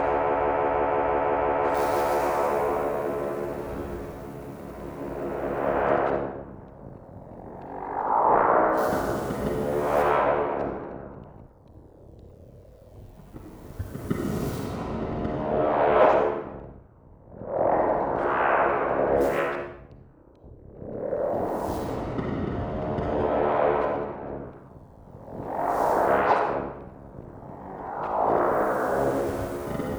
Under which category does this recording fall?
Sound effects > Electronic / Design